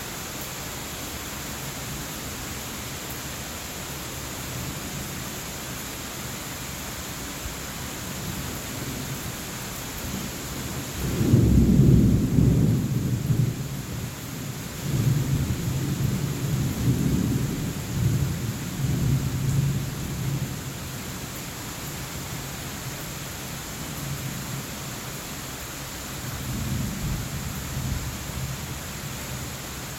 Soundscapes > Nature
STORM-Samsung Galaxy Smartphone, CU Thunderstorm, Rain, Looped Nicholas Judy TDC
Looped rain and thunder track.